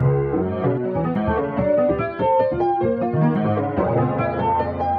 Music > Other
Unpiano Sounds 014
Distorted, Distorted-Piano, Piano